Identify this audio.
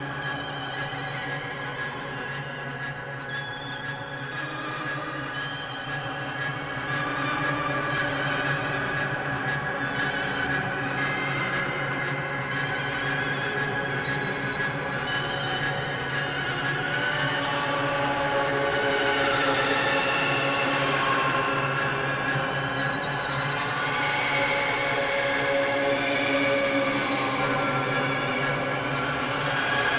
Soundscapes > Synthetic / Artificial
Tension-soundtrack5
The worst place on Earth (in a film-maker´s studio?) - just joking. Part 5 of an experimental series of soundtracks using the modulation powers of 0-CTRL from Makenoise to get some more creepiness out of the system. out there. Synth back and get nightmares - I dare you to enjoy this ;)